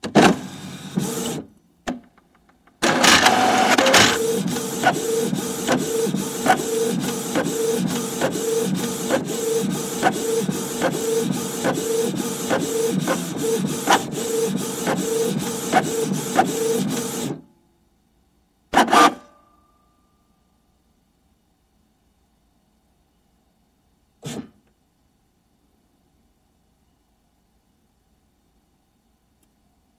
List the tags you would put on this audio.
Sound effects > Objects / House appliances
computer
nostalgia
ASMR
printing
Canon
Canon-Pixma
Inkjet
Pixma
ink-jet
mechanical
office
technology
robot
printer
work
nk-jet

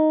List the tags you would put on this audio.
Instrument samples > String
design
guitar
sound
tone

